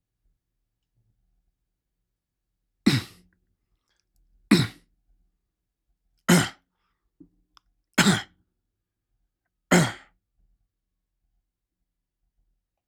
Speech > Solo speech
strenuous grunts
Me making grunting sounds. Used for someone exerting force.
human, male, man, grunt